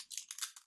Instrument samples > Percussion
Cellotape Percussion One-Shots A collection of crisp, sticky, and satisfyingly snappy percussion one-shots crafted entirely from the sound of cellotape. Perfect for adding organic texture, foley-inspired rhythm, or experimental character to your beats. Ideal for lo-fi, ambient, glitch, IDM, and beyond. Whether you're layering drums or building a track from scratch, these adhesive sounds stick the landing.